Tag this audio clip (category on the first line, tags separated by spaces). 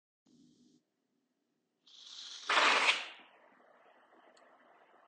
Sound effects > Other
card echo scratching